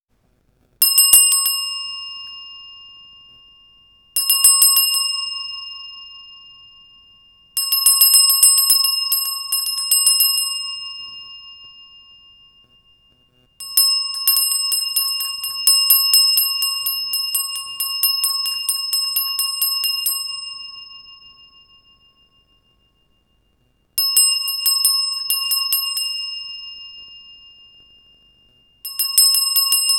Sound effects > Other
handbell sounded in front of microphone
bell, chime, ring, tingle